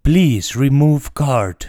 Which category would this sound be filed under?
Speech > Solo speech